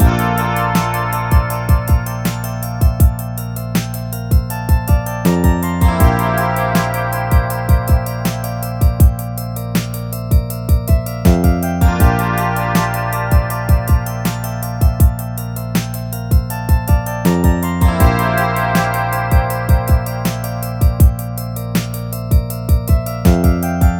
Music > Multiple instruments
Rap Instrumental (Always on my mind) 3
I felt it'd be interesting to add a beat to it to create a rap ballad instrumental. It has a tempo of 80 bpm and can be looped seamlessly on its own or with the other "Always On My Mind" samples.
80-bpm, 80-bpm-hip-hop, 80-bpm-rap, hip-hop, hip-hop-ballad-beat, hip-hop-hook, hip-hop-instrumental, hip-hop-sample, love-ballad-rap, love-hip-hop-song, love-rap-song, rap, rap-ballad-beat, rap-ballad-hook, rap-ballad-instrumental, rap-hook, rap-instrumental, rap-instrumental-music, rap-sample, romantic-hip-hop, romantic-rap-instrumental, sentimental-hip-hop, sentimental-hip-hop-beat, sentimental-rap, sentimental-rap-beat